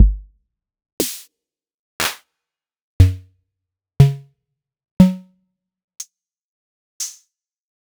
Instrument samples > Percussion
Lucia Drum Kit #002
kick, tom, thwack, percussion, kit, snare, hihat, synth, woodblock, cowbell, drum, rimshot